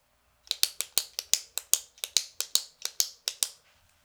Sound effects > Objects / House appliances
A pen clicking at medium speed. Pens. Clicks. A stressed student. An annoyed office coworker. A focused doctor. Anything you want! You're welcome! :) Recorded on Zoom H6 and Rode Audio Technica Shotgun Mic.